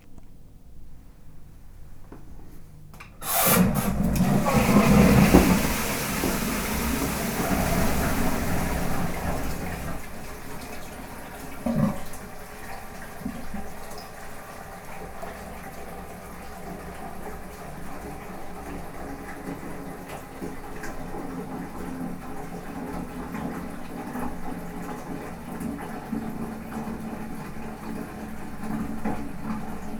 Sound effects > Objects / House appliances
Edwardian toilet flush
The sound made by an UK Edwardian-era high level toilet cistern being flushed and refilled. The banging sound at the beginning is the cistern chain's pot handle hitting the wall. Recording made using an Edirol R-09HR field recorder in March 2009.